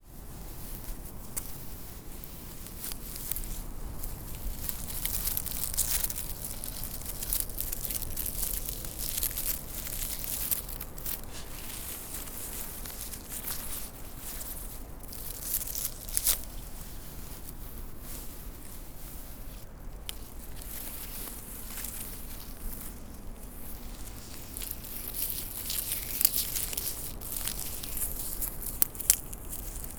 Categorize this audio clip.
Soundscapes > Nature